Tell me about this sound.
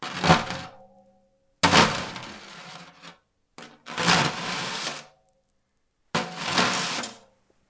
Music > Solo instrument
Low FloorTom Sizzle Chain Perc Scrape FX
Custom, Cymbal, Cymbals, Drum, Drums, FX, GONG, Kit, Metal, Percussion